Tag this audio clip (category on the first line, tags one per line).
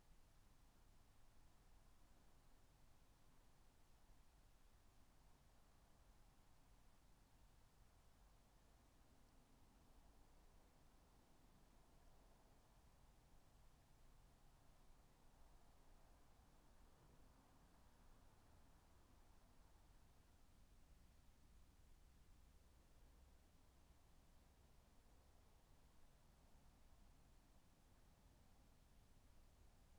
Soundscapes > Nature
Dendrophone
field-recording
data-to-sound
artistic-intervention
natural-soundscape